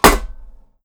Sound effects > Objects / House appliances
METLImpt-Blue Snowball Microphone, CU Lunchbox, Drop Nicholas Judy TDC
A metal lunchbox drop.
Blue-brand, Blue-Snowball, drop, foley, lunchbox, metal